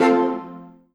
Instrument samples > Synths / Electronic
String Wow
A short fanfare sound made for a short cartoon film. Uses samples of strings.
power, chord, yay, ceremonial, flouish, hit, sfx, strings, fanfare, happy, ui, stinger, sound-effect, major-key